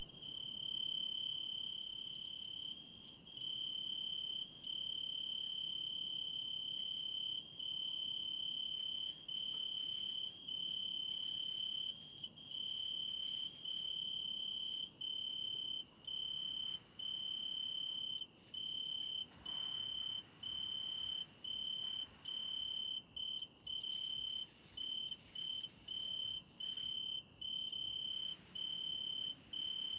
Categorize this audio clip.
Soundscapes > Nature